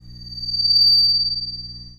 Sound effects > Electronic / Design
static Feddback
Shotgun mic to an amp. feedback.
noise, shortwave